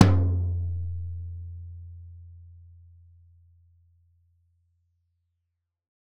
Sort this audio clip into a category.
Music > Solo percussion